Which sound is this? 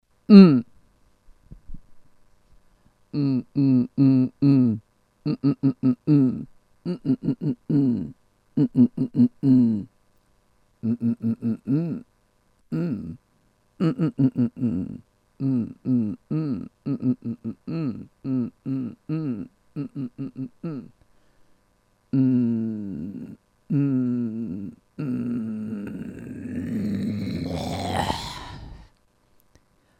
Sound effects > Experimental
Sound M M M M background VOCAL FX
BACKGROUND, bump, change, FEMALE, FX, hit, Imaging, low-rumble, M, Promotion-FX, Radio, radio-fx, UNIVERSAL, VOCAL, VOICE, wipe, WOMAN